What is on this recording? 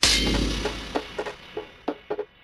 Sound effects > Electronic / Design
Impact Percs with Bass and fx-030
From a collection of impacts created using a myriad of vsts and samples from my studio, Recorded in FL Studio and processed in Reaper